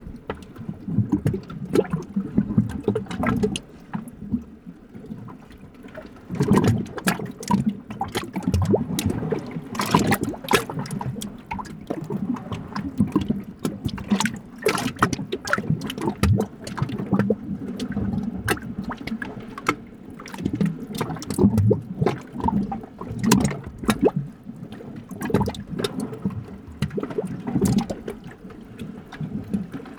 Sound effects > Natural elements and explosions
The sound reproduction of a boiling cauldron, created by recording waves hitting a cavity in the sea rocks. Recorded with a Zoom H1essential